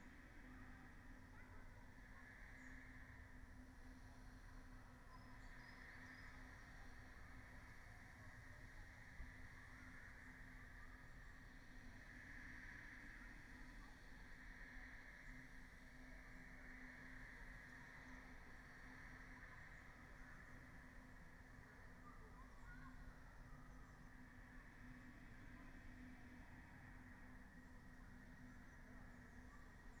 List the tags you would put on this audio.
Soundscapes > Nature
alice-holt-forest Dendrophone field-recording natural-soundscape phenological-recording raspberry-pi soundscape

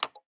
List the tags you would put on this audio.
Sound effects > Human sounds and actions
activation interface button toggle off switch click

